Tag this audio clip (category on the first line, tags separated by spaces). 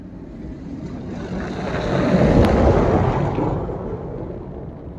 Sound effects > Vehicles
car automobile vehicle